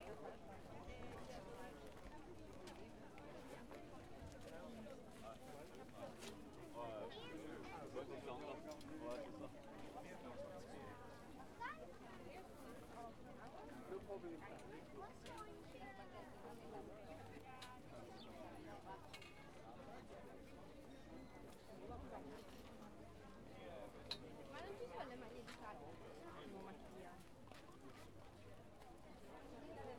Soundscapes > Urban
Calpe Market 2
market, street